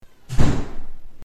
Sound effects > Objects / House appliances
door slam / close sound Accidently recorded sound from my video using a Xiaomi Redmi 12 phone microphone and also edited volume by Audacity.